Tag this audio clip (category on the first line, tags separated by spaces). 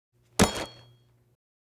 Sound effects > Objects / House appliances
HIT DAGGER KNIFE SWORD DROP RING DIRT METAL FALL BLADE GROUND